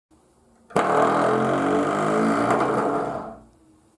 Sound effects > Objects / House appliances
Door Stopper Fling
Me flinging a door stopper to make it go boioioioing.
stretch, pull